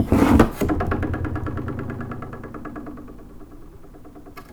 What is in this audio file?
Sound effects > Other mechanisms, engines, machines
Handsaw Beam Plank Vibration Metal Foley 16
hit, sfx, metallic, plank, household